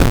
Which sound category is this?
Sound effects > Experimental